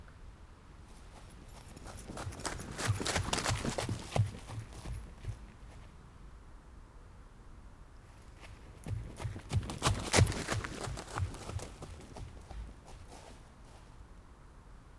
Sound effects > Human sounds and actions
walking run
Running in the forest
fast, forest, running, walk, walking